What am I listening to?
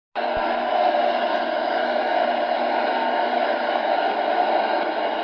Sound effects > Vehicles
tram driving by6
field-recording, track, traffic, tram